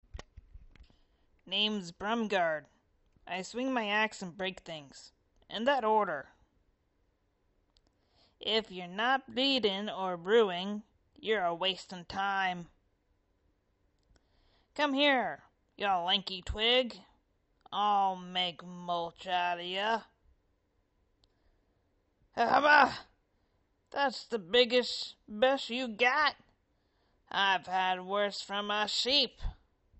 Speech > Solo speech
Character Voice Pack: Grumpy Dwarf Warrior (fantasy / gruff / slightly comedic)
A full mini voice pack for a classic grumpy dwarf warrior—great for RPGs, animations, or soundboards. 1. Greeting / Introduction “Name’s Brumgar. I swing axes and break things. In that order.” 2. Idle / Casual Line “If yer not bleeding or brewing, you’re wastin’ time.” 3. Attack / Combat Line “Come here, ya lanky twig! I’ll make mulch outta ya!” 4. Hurt / Pain Reaction “Ugh—bah! That the best ye got? I’ve had worse from a sheep!” 5. Annoyed / Sarcastic “Oh great, another hero with a shiny sword and no brains…” 6. Victory / After Combat “Another win for the beard! Someone bring me ale—or a nap.”
voiceover, dwarfvoice, script